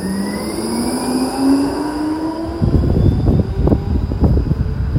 Sound effects > Vehicles
Tram driving near station at low speed in Tampere. Recorded with iphone in fall, humid weather.
city, Tampere, traffic, tram, field-recording